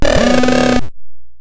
Sound effects > Electronic / Design
Optical Theremin 6 Osc dry-055
Trippy, Sci-fi, Handmadeelectronic, Experimental, Robotic, FX, Analog, Optical, Electronic, noisey, Digital, Robot, Infiltrator, Theremin, DIY, Otherworldly, Glitch, Sweep, Dub, Scifi, Noise, Synth, Electro, Instrument, Theremins, Bass, SFX, Glitchy, Spacey, Alien